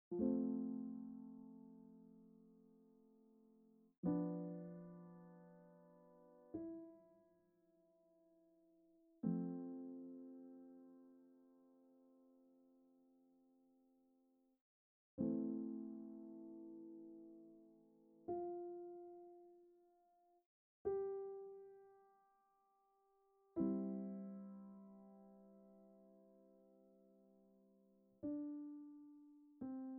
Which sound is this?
Instrument samples > Piano / Keyboard instruments
Random piano sample
guess who finally started using their midi keyboard, i did! i suck at playing the piano but whatever, heres what i can play so far!! so good right? righgt??